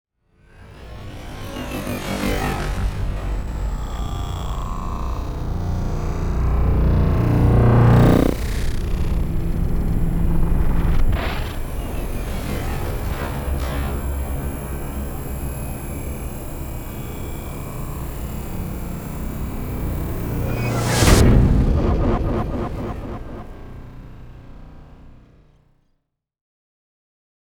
Sound effects > Other
Sound Design Elements SFX PS 070
bass, boom, cinematic, deep, effect, epic, explosion, game, hit, impact, implosion, indent, industrial, metal, movement, reveal, riser, stinger, sub, sweep, tension, trailer, transition, video, whoosh